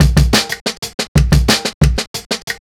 Music > Other
drums 182 bpm speed
break, quantized, drumloop, percs, groovy, drums, percussion-loop, breakbeat, jungle